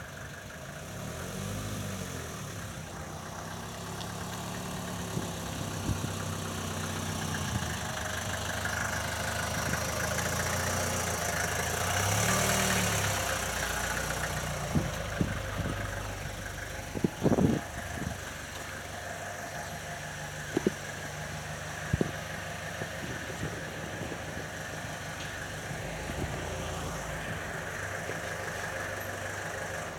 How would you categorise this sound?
Sound effects > Vehicles